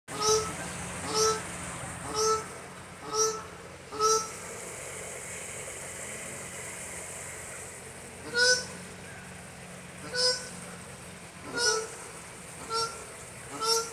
Sound effects > Animals
Waterfowl - South African Shelduck, Stream in Background
Recorded at Hope Ranch with an LG Stylus 2022. These are the goose-like honks of a South African shelduck.
africa, bird, aviary, south-africa, shelduck, duck, fowl, stream, waterfowl